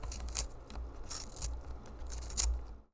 Sound effects > Objects / House appliances
OBJWrite-Blue Snowball Microphone Pencil Sharpener, Manual, Sharpening Pencil Nicholas Judy TDC
A manual pencil sharpener sharpening a pencil.
Blue-brand, Blue-Snowball, foley, manual, pencil, pencil-sharpener, sharpen